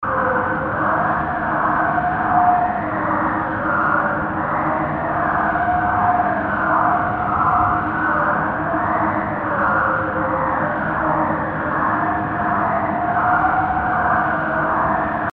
Sound effects > Electronic / Design
Windy Breath Scream
Wind like noise generated by synth and processed with vowel filter and reverb